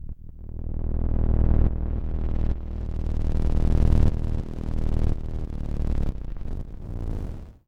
Sound effects > Experimental
Analog Bass, Sweeps, and FX-076
alien, basses, complex, electro, electronic, korg, machine, mechanical, retro, robot, robotic, sci-fi, sfx, snythesizer, sweep, vintage